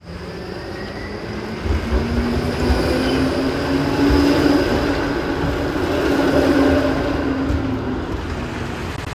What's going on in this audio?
Soundscapes > Urban
Tram passing Recording 27
Rail, Train, Tram